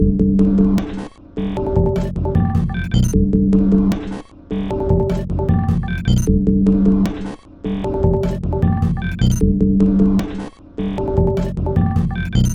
Instrument samples > Percussion
This 153bpm Drum Loop is good for composing Industrial/Electronic/Ambient songs or using as soundtrack to a sci-fi/suspense/horror indie game or short film.
Alien, Ambient, Dark, Loopable, Packs, Samples, Underground, Weird